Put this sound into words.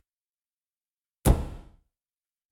Sound effects > Objects / House appliances
Book dropped on table
Just an heavy leather-bound book being dropped on a wooden table. Recorded with a mic attached to the edge of the table.
thud, bam, impact, thump, bang, hit, drop